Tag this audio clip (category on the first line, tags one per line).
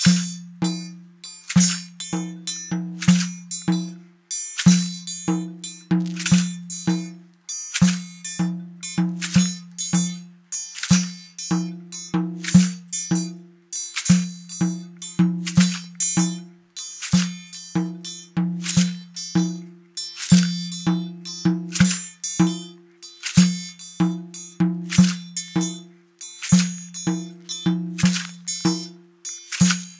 Music > Solo percussion
groovy
percussion-loop
rhythm